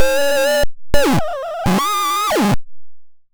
Sound effects > Electronic / Design
Optical Theremin 6 Osc dry-026
Bass, Digital, DIY, Dub, Electro, Electronic, Experimental, Glitchy, Instrument, Noise, noisey, Optical, Robotic, Sci-fi, Spacey, Theremins, Trippy